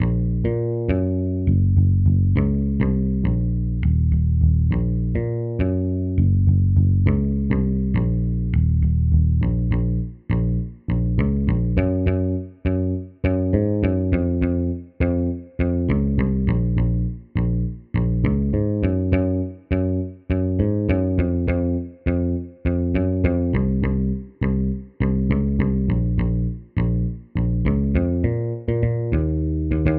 Music > Solo instrument
Three bass guitar riffs in A# minor at 102 BPM. Made using the Bass Guitar pack for Spitfire LABS in REAPER. First of two parts.